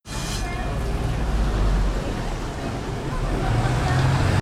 Sound effects > Vehicles
Bus coming towards mic